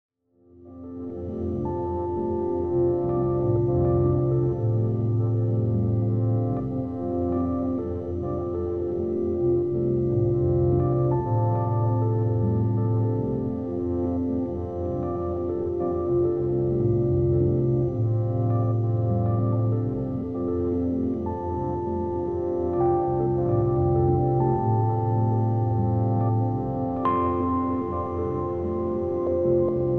Music > Other
We can all stand still watching, but we shouldn't.
ambient, atmosphere, dreamy, drone, glitch, lofi, melody, rhodes, soundscape